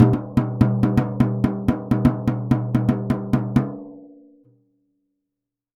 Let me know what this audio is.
Music > Solo instrument
Delicate Tom Rhythm-003
Crash, Custom, Cymbal, Cymbals, Drum, Drums, FX, GONG, Hat, Kit, Metal, Oneshot, Paiste, Perc, Percussion, Ride, Sabian